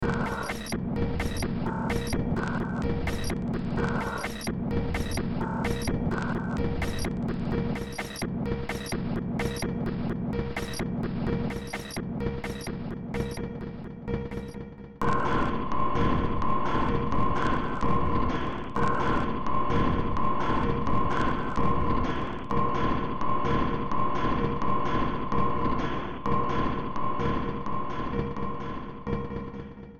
Music > Multiple instruments
Demo Track #2938 (Industraumatic)
Ambient, Horror, Sci-fi, Underground